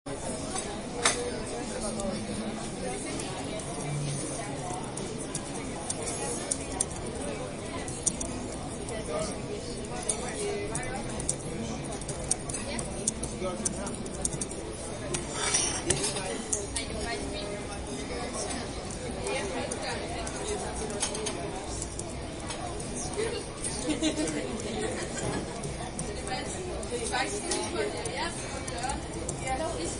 Soundscapes > Urban
marzano-5min-1
Sitting on a restaurant terrace in Budapest, background noise / chatter, some nearby traffic